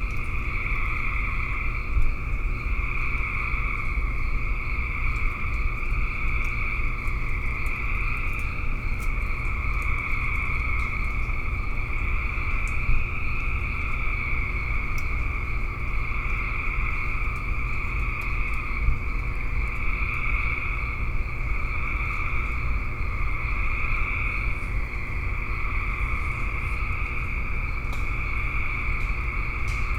Soundscapes > Nature
AMBForst-Summer Nighttime, Gravel Hiking Trail near campsite road, Mammoth Cave National Park, crickets, frogs, distant traffic QCF Mammoth Cave KY Zoom F3 with LCT 440 Pure
Nighttime along gravel hiking trail near campsite road, Mammoth Cave National Park, KY, summertime.
crickets, field-recording, forest, nature, nighttime, woods